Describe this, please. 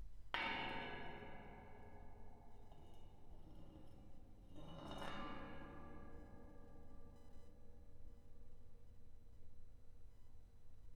Sound effects > Objects / House appliances
A glass bottle being scraped against a metal staircase. Recorded with a Zoom H1.